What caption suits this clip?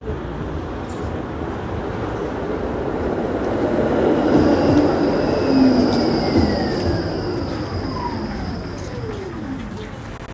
Sound effects > Vehicles
Tram sound
Tram arrival and departure sequences including door chimes and wheel squeal. Wet city acoustics with light rain and passing cars. Recorded at Sammonaukio (17:00-18:00) using iPhone 15 Pro onboard mics. No post-processing applied.
light, iPhone, city, rain, mics, 15, Tram, Pro